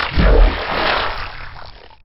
Sound effects > Natural elements and explosions
• I applied Spectralizer on WaveLab 6. • I created brown noise on WaveLab 11 and I made Audition to mimic the envelope of the main waveform. • I applied Restoration on WaveLab 11. • I EQed out the nasty frequencies. • I merged/blended/mixed the original file with the attenuated effect file. The resulting wavefile is NOT superior because the initial waveform was unclearly recoded. The correct thing to do is to ask AI the best microphone for a particular job, then buy a good Chinese clone (the term clone is legally vague; it's not necessarily illegal because it has various interpretations), ask AI how to record correctly the specific thing, and RE-RECORD!
watery, fenny, muddy, wet, squelchy, splash, plunge, miry, shit, splatter, nature